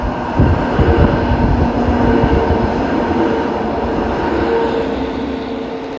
Soundscapes > Urban
ratikka4 copy
The tram driving by was recorded In Tampere, Hervanta. The sound file contains a sound of tram driving by. I used an Iphone 14 to record this sound. It can be used for sound processing applications and projects for example.
tram, traffic, vehicle